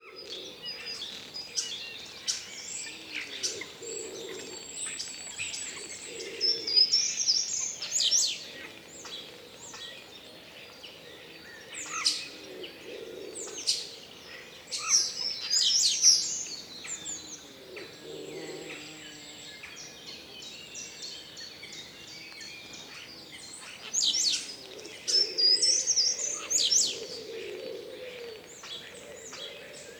Soundscapes > Nature
Ambient soundscape of a Polish forest. Recorded in Poland, this track features various birdsong and natural background sounds typical for a forest environment. No human noise or mechanical sounds. Effects recorded from the field.